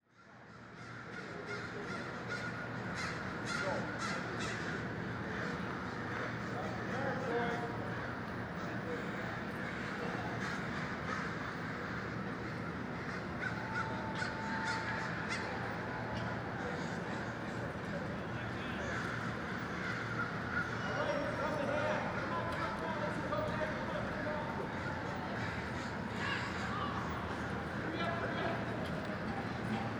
Soundscapes > Urban
People running the 2025 Portland Half Marathon. Recorded near the start so everyone is still packed together, easily several thousand runners passing by.